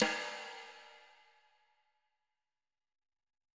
Music > Solo percussion
Snare Processed - Oneshot 21 - 14 by 6.5 inch Brass Ludwig
percussion, rimshot, brass, drum, fx, realdrums, rimshots, drums, snare, drumkit, ludwig, rim, crack, hit, perc, roll, reverb, acoustic, processed, kit, beat, flam, snareroll, sfx, snaredrum, realdrum, hits, oneshot, snares